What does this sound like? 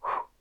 Sound effects > Human sounds and actions
A simple blowing sound made by my mouth, I use this for my game dev for stealth games that involve blowing out candles to remove lights.